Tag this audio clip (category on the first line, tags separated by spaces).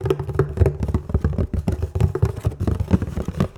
Sound effects > Objects / House appliances
cleaning lid bucket liquid scoop foley tip slam debris hollow shake knock spill water pour metal fill pail clang container garden carry kitchen object tool handle household plastic drop clatter